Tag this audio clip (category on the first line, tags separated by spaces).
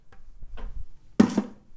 Sound effects > Other mechanisms, engines, machines
bam bang boom bop crackle foley fx knock little metal oneshot perc percussion pop rustle sfx shop sound strike thud tink tools wood